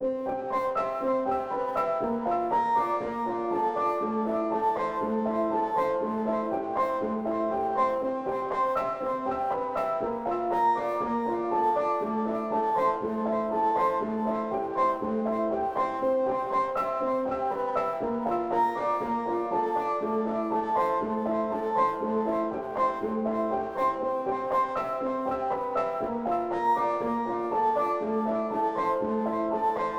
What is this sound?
Music > Solo instrument
Piano loops 196 efect 3 octave long loop 120 bpm
120bpm, music, simplesamples